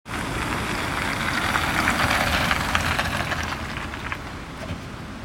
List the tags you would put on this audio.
Sound effects > Vehicles
rain tampere

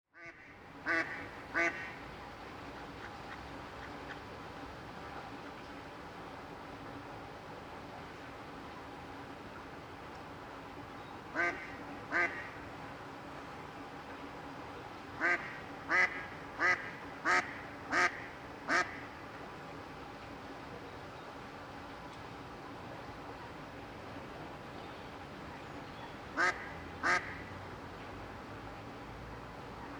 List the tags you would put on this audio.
Soundscapes > Urban
lake morning pool birds field-recording ambience city-centre

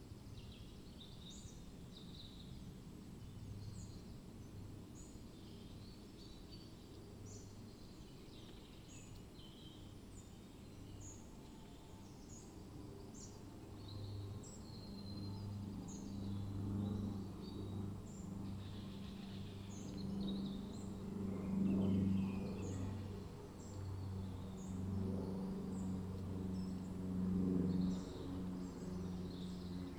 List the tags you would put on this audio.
Soundscapes > Nature
artistic-intervention Dendrophone field-recording modified-soundscape nature phenological-recording raspberry-pi sound-installation soundscape weather-data

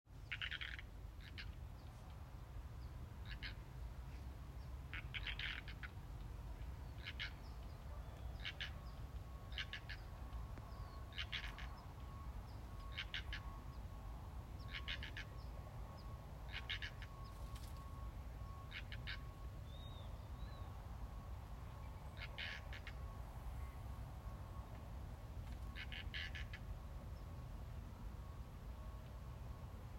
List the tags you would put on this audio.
Soundscapes > Nature
countriside evening farm frogs

